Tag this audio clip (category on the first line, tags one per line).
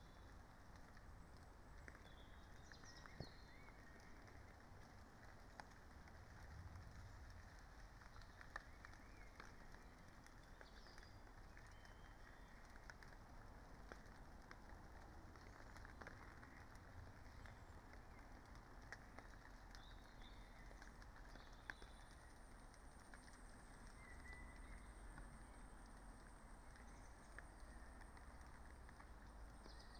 Soundscapes > Nature
artistic-intervention
Dendrophone
raspberry-pi
weather-data
modified-soundscape
soundscape
phenological-recording
sound-installation
natural-soundscape